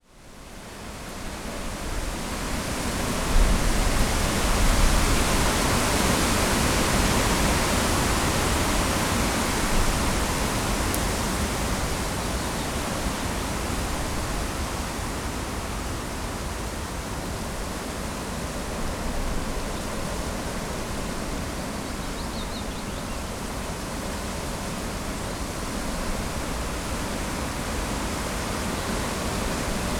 Soundscapes > Nature
A recording at Campfield Marsh RSPB site.
ambience, birds, distant, field, outdoor, recording, strong, winds